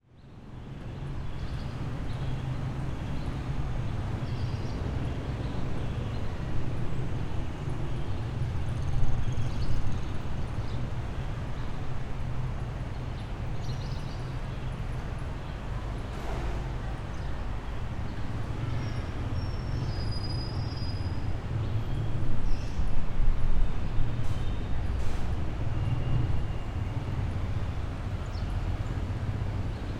Soundscapes > Urban
250801 115730 PH Loud siren in Calapan
Loud warning siren in Calapan city at 12PM. Everyday at 7AM, 12PM and 5PM, people living in Calapan city (Oriental Mindoro, Philippines) can hear the loud siren audible at #0:42. As I recorded it as 12PM, one can also hear some people passing by while chatting, and traffic in the town. Recorded in August 2025 with a Zoom H6essential (built-in XY microphones). Fade in/out applied in Audacity.
alarm, alert, ambience, atmosphere, bomb, Calapan-city, city, civil, danger, defence, disaster, field-recording, fire, hurricane, loud, military, noisy, people, Philippines, siren, soundscape, tornado, town, traffic, typhoon, urban, vehicles, voices